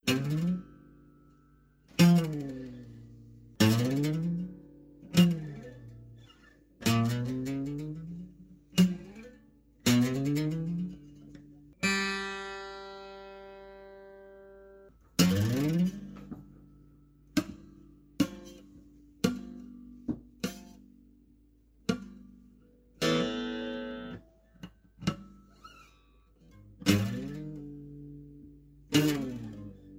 Music > Solo instrument
TOONTwang-Samsung Galaxy Smartphone, MCU Guitar, Acoustic, Boings, Twangs Nicholas Judy TDC
Acoustic guitar boings and twangs.
acoustic, boing, guitar, Phone-recording, twang